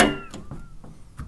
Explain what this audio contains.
Sound effects > Other mechanisms, engines, machines
Handsaw Pitched Tone Twang Metal Foley 20
plank, hit, household, perc, sfx, smack, twang, vibration, fx, shop, foley, metal, vibe, tool, metallic, twangy, saw, handsaw, percussion